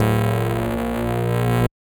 Sound effects > Electronic / Design
OBSCURE HARSH CHIPPY BLOOP
BEEP, BOOP, CHIPPY, CIRCUIT, COMPUTER, DING, ELECTRONIC, EXPERIMENTAL, HARSH, HIT, INNOVATIVE, OBSCURE, SHARP, SYNTHETIC, UNIQUE